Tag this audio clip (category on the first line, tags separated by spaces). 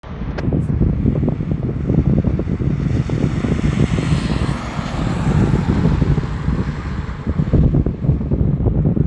Soundscapes > Urban
car city driving tyres